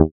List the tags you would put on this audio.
Instrument samples > Synths / Electronic
additive-synthesis fm-synthesis

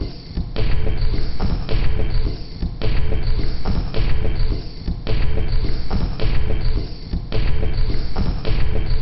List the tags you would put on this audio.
Instrument samples > Percussion
Drum Dark Loop Soundtrack Alien Industrial Loopable

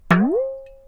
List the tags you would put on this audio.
Sound effects > Objects / House appliances
natural metal mechanical industrial bonk glass object foley sfx fieldrecording perc hit oneshot fx foundobject stab drill percussion clunk

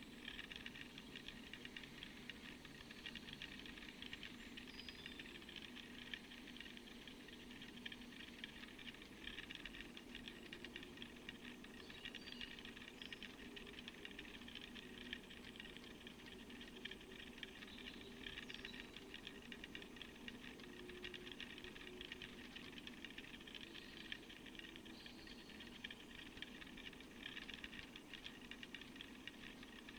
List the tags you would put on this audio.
Soundscapes > Nature
artistic-intervention field-recording phenological-recording alice-holt-forest nature soundscape modified-soundscape data-to-sound Dendrophone sound-installation natural-soundscape raspberry-pi weather-data

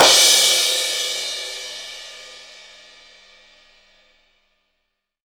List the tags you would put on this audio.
Instrument samples > Percussion
multicrash multi-China sinocrash metal Soultone shimmer Paiste Avedis polycrash Zildjian Sabian crash Istanbul low-pitched China crunch bang

